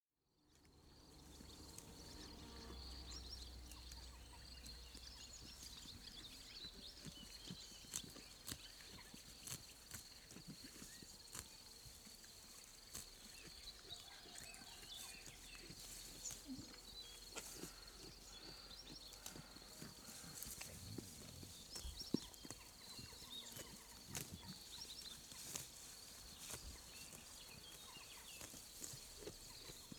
Soundscapes > Nature
Moutons Chateau Matin
2 sheeps and 2 lambs walking and grazing in a little field in the morning, in the village of Chateau, Bourgogne, France. Crickets and many birds. Neighbours making car noises, before their children go to school. Some distant car. At 1/2 of the recording, one of the sheeps gets really close to the microphones. Recorded on may 26th 2025, 2xEM272 microphones in ~1m wide AB stereo.
grass, village, grazing, lamb